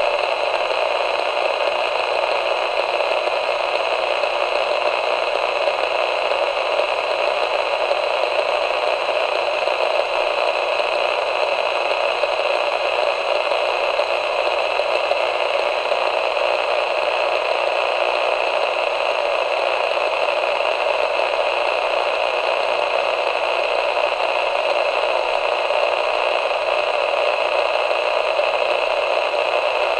Soundscapes > Synthetic / Artificial
An AM radio static buzzing. Recorded from a Nickelodeon Time Blaster.